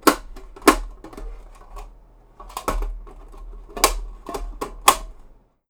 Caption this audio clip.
Sound effects > Objects / House appliances
OBJCont-Blue Snowball Microphone, CU Lunchbox, Metal, Open, Close Nicholas Judy TDC
A metal lunchbox opening and closing.
open, Blue-Snowball, close, metal, lunchbox, Blue-brand, foley